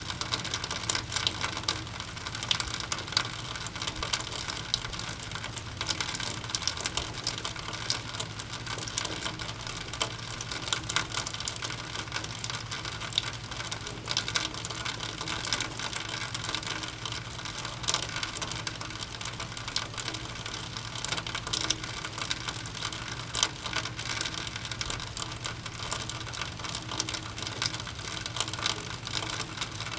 Soundscapes > Nature
Rain & Water Exiting a Roof Duct
The Sound of Rainwater Exiting a Roof Duct with the slight sound of Rain in the Background. Recorded with a ZOOM H6 and a Sennheiser MKE 600 Shotgun Microphone. Go Create!!!
Environment, Nature, Rain, Storm, Water